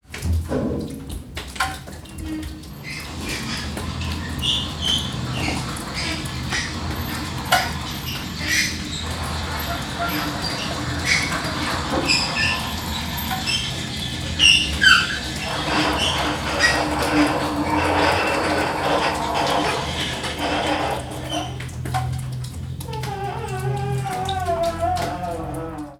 Sound effects > Other mechanisms, engines, machines
Ambient sound. Mechanical sounds of the polanco elevator in Valparaiso, Chile.